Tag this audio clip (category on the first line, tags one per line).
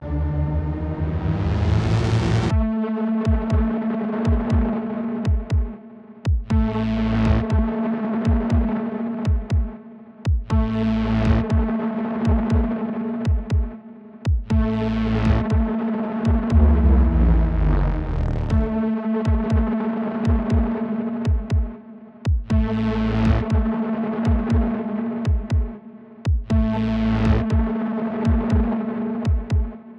Music > Multiple instruments
ambience
Background
BGM
dark
fear
horror
music
synth
tension